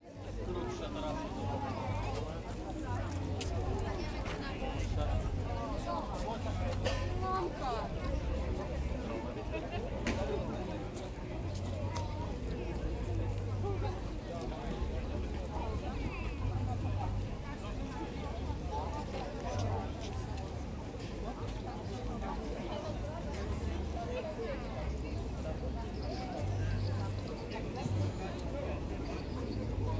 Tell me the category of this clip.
Soundscapes > Urban